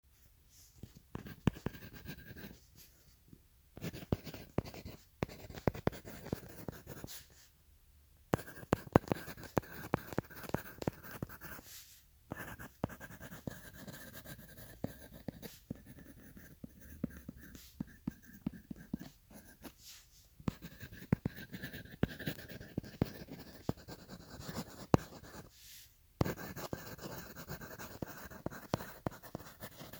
Sound effects > Objects / House appliances
Writing on paper with pencil 01
pencil, sheet, signature